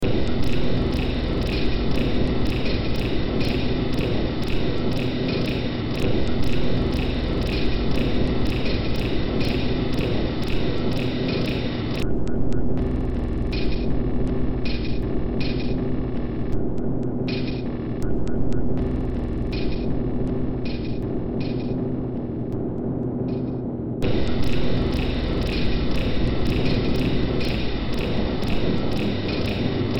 Music > Multiple instruments
Demo Track #3215 (Industraumatic)
Ambient, Cyberpunk, Games, Horror, Industrial, Noise, Sci-fi, Soundtrack, Underground